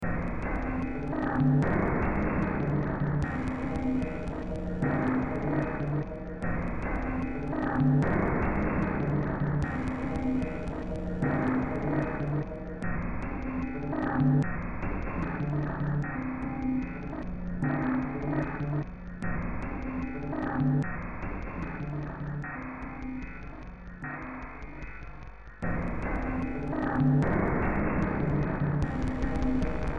Music > Multiple instruments
Demo Track #3668 (Industraumatic)
Horror, Cyberpunk, Ambient, Soundtrack, Noise, Games, Underground, Industrial, Sci-fi